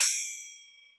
Sound effects > Other
Reminiscent of "that" game...but meticulously crafted from original sources.